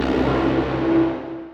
Instrument samples > Synths / Electronic
CVLT BASS 26
bass, drops, sub, wobble, low